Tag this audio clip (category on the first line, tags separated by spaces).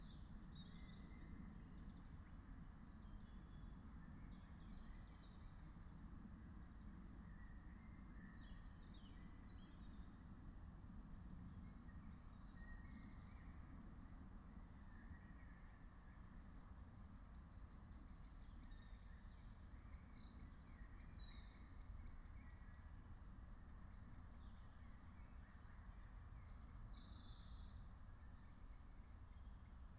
Soundscapes > Nature
alice-holt-forest phenological-recording meadow nature natural-soundscape raspberry-pi soundscape field-recording